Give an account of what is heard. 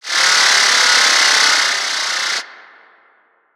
Sound effects > Electronic / Design
techno noise (cyberpunk ambience)
80s, ambience, cyberpunk, electronic, retro, sfx, synth, synthwave, techno